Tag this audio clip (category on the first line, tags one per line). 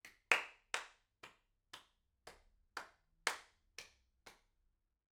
Sound effects > Human sounds and actions
AV2
clap